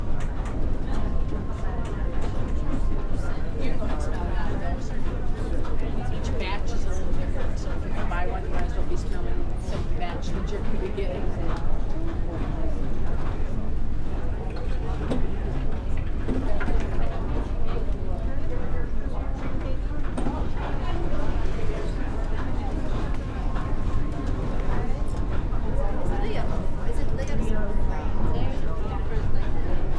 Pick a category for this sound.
Soundscapes > Urban